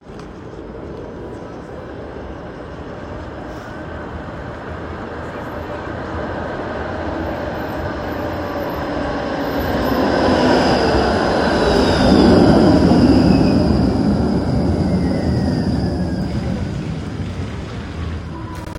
Sound effects > Vehicles
Tram's sound 1
Urban Tram Stop - Wet Evening - Sammonaukio Description: Tram arrival and departure sequences including door chimes and wheel squeal. Wet city acoustics with light rain and passing cars. Recorded at Sammonaukio (19:00-20:00) using iPhone 15 Pro onboard mics. No post-processing applied.
city, field-recording, tram